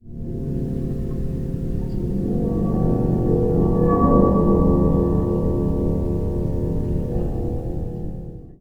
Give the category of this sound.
Soundscapes > Other